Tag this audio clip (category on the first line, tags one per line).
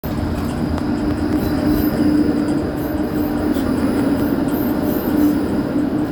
Sound effects > Vehicles
city-center
tram
transport